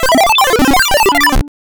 Sound effects > Experimental
loud random notes
made in openmpt with a ton of random waves and pitches. idk what im doing
ddo, ur, retro, test, randomnotes, idk, random-notes, u, haii, how, notes, if, experimental, this, random, spell, reading, asdfghjkl